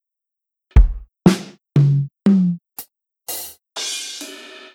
Instrument samples > Percussion
Drumsamples4secSigned 16bits PCM
Drum samples for arduino....
Analog, Drum, Sample